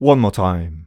Speech > Solo speech
One more time
time, Man, Single-take, dry, Male, Mid-20s, one, FR-AV2, more, hype, Tascam, Neumann, un-edited, U67, voice, oneshot, raw, Vocal, chant, singletake